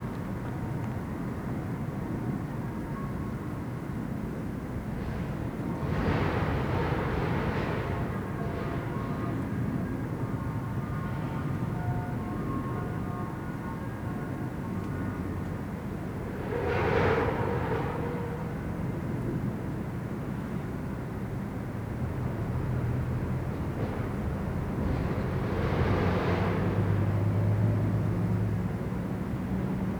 Soundscapes > Urban
Splott - Distant Industrial Noise Distant Ice Cream Van - Seawall Road
wales, splott